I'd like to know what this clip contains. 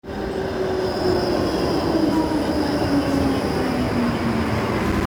Soundscapes > Urban
Sound of tram moving near a stop in Tampere. Recorded with Apple iPhone 15.
tram, transport, streetcar